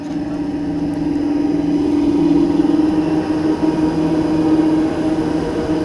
Sound effects > Vehicles
A tram passing by in Tampere, Finland. Recorded with OnePlus Nord 4.